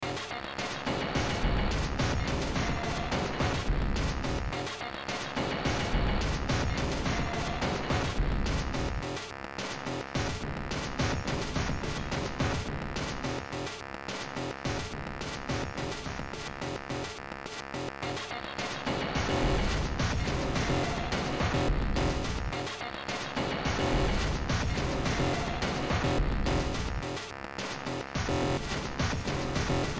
Music > Multiple instruments
Demo Track #3948 (Industraumatic)
Ambient, Cyberpunk, Games, Horror